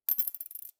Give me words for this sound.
Sound effects > Objects / House appliances
coin foley coins change jingle tap jostle sfx fx percusion perc